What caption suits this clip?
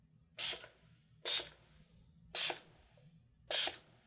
Sound effects > Objects / House appliances
Perfume Cologne Spray
A perfume bottle spraying. Cologne. Spritz. Body Mist. Before a date. After a shower. Into a love letter. Whatever you'd like! Enjoy! :) Recorded on Zoom H6 and Rode Audio Technica Shotgun Mic.
cologne, perfume, spraycan, spraying, spritz